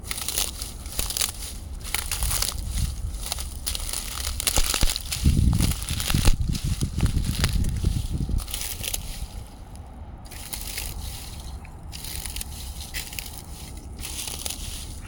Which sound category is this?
Sound effects > Other